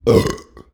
Sound effects > Human sounds and actions
Burp - medium-deep
A genuine burp into a Sennheiser MKE 600, recorded indoors.
burp, burping, disgusting, FR-AV2, genuine, human, male, medium-deep, mke600, Tascam